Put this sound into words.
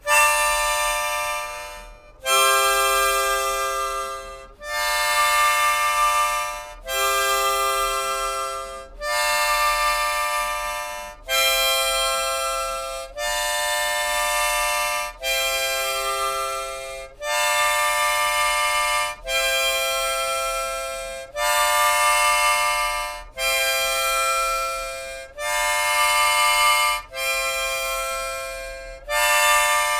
Music > Solo instrument
MUSCWind-Blue Snowball Microphone, CU Harmonica Notes Nicholas Judy TDC
Blue-brand, Blue-Snowball, harmonica, note, sample